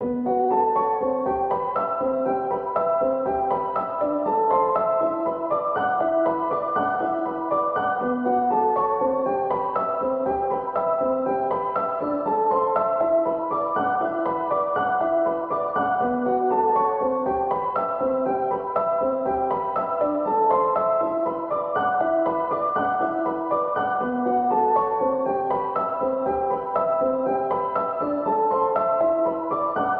Music > Solo instrument
Piano loops 111 efect 4 octave long loop 120 bpm
120, piano, reverb, free, loop, samples, music, simple, simplesamples, pianomusic, 120bpm